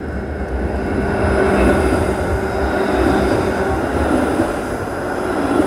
Sound effects > Vehicles
tram sunny 06
A recording of a tram passing by on Insinöörinkatu 41 in the Hervanta area of Tampere. It was collected on November 12th in the afternoon using iPhone 11. The weather was sunny and the ground was dry. The sound includes the whine of the electric motors and the rolling of wheels on the tracks.
motor, sunny, tram